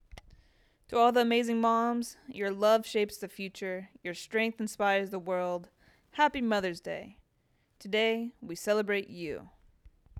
Speech > Solo speech
Inspirational Mother's Day Message – Uplifting and Proud
An uplifting and inspirational Mother’s Day voice-over, perfect for motivational videos, celebrations, and heartfelt thank-you messages. "To all the amazing moms — your love shapes the future, your strength inspires the world. Happy Mother’s Day. Today, we celebrate YOU!"